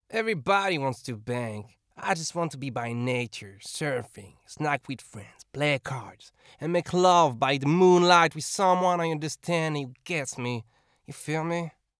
Speech > Solo speech
Surfer dude - I just want to be by nature
Subject : Recording my friend going by OMAT in his van, for a Surfer like voice pack. Date YMD : 2025 August 06 Location : At Vue de tout albi in a van, Albi 81000 Tarn Occitanie France. Shure SM57 with a A2WS windshield. Weather : Sunny and hot, a little windy. Processing : Trimmed, some gain adjustment, tried not to mess too much with it recording to recording. Done inn Audacity. Some fade in/out if a one-shot. Notes : Tips : Script : Everybody wants to bank, I just want to be by nature surfin’, snack with friends, play cards, and make love by the moon light with someone I understand and who understands me. You feel me?
2025, 20s, A2WS, Adult, August, Cardioid, Dude, English-language, France, FR-AV2, In-vehicle, Male, mid-20s, Mono, one-shot, oneshot, RAW, sentence, Single-mic-mono, SM57, Surfer, Tascam, VA, Voice-acting